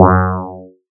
Instrument samples > Synths / Electronic
DUCKPLUCK 1 Gb
additive-synthesis, bass, fm-synthesis